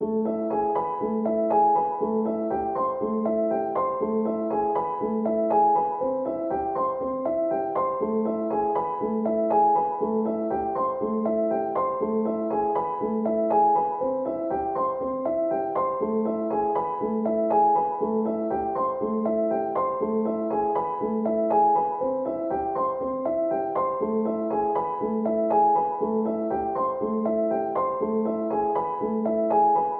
Music > Solo instrument
Piano loops 193 octave short loop 120 bpm
120, 120bpm, free, loop, music, piano, pianomusic, reverb, samples, simple, simplesamples